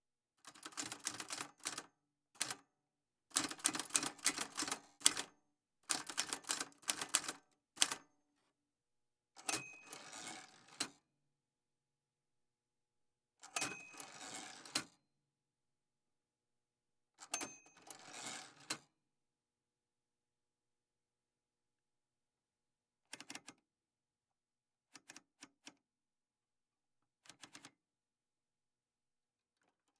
Sound effects > Objects / House appliances
A foley recording of a vintage typewriter close to the microphone. Various typewriter sounds including typing, dings and roller mechanism.
Una grabación de foley de una máquina de escribir vintage a distancia cercana del micrófono. Sonidos de escritura, campana y mecanismo de rollo.